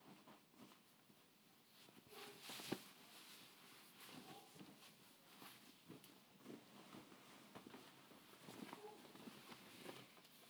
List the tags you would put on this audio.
Sound effects > Human sounds and actions
experimental field-recording

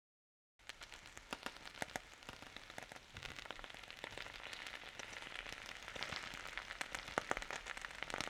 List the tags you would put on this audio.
Sound effects > Experimental
Food Gravel vehicle

